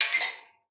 Sound effects > Other
A recording of a Metal gate being pulled. Edited in RX 11.
creaking, gate, high, metal, outdoor, pitched